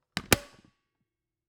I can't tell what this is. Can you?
Sound effects > Objects / House appliances
250726 - Vacuum cleaner - Philips PowerPro 7000 series - Top handle n bucket lock Closing
MKE600, Sennheiser, Shotgun-microphone, vacuum-cleaner, Powerpro, FR-AV2, 7000, Tascam, Powerpro-7000-series, vacuum, Shotgun-mic, Hypercardioid, cleaner, aspirateur, Single-mic-mono, MKE-600, Vacum